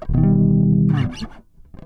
Music > Solo instrument
sus chord 5
slide, riffs, chuny, basslines, bassline, riff, rock, electric, slides, note, pick, blues, fuzz, bass, notes, low, harmonic, harmonics, chords, electricbass, funk, slap, lowend, pluck